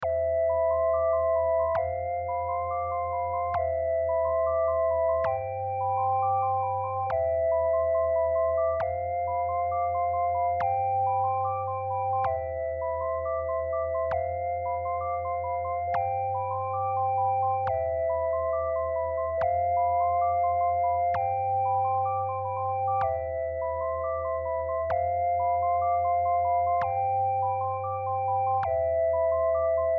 Music > Multiple instruments
A main soundtrack for plataform games.